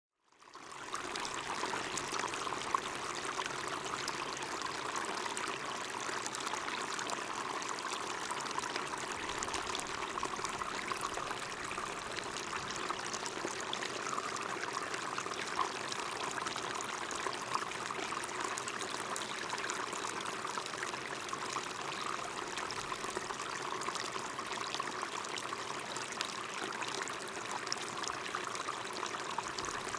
Soundscapes > Nature
Snow melt trickle. Bragg Creek Alberta. April 4, 2025. 1155 MDT
Recorded April 4, 2025 1155 MDT at Beaver Flats Ponds west of Bragg Creek Alberta. Small snow melt trickle entering beaver pond. 15° C, sunny, low winds. Recorded with Rode NTG5 supercardoid shotgun microphone in Movo blimp on pole, deadcat wind protection. Mono. Low cut 100 Hz, normalization, content cuts, and fades in/out in Izotope RX10. Thank you!